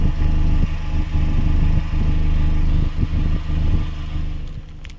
Sound effects > Other mechanisms, engines, machines
clip auto (5)
Toyota, Auto, Avensis